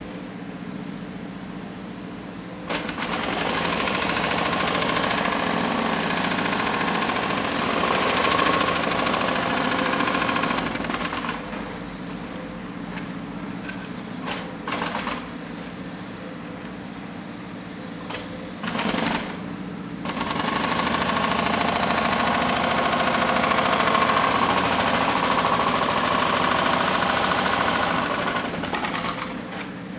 Soundscapes > Urban
A pneumatic drill on a building site, recorded from a second story window via Zoom H2n. The drill runs in short bursts, breaking up concrete, a small cement mixer runs in the background. The drilling stops briefly, before continuing.